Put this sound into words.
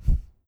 Sound effects > Other
Generic low "whoosh" effect generated by blowing air in mouth and cheeks only, no lungs and diaphragm involved. Recorded with Zoom H2.